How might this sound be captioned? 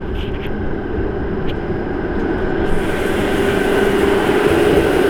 Sound effects > Vehicles
Tram00043128TramPassing
tramway, vehicle, city, transportation, winter, field-recording, tram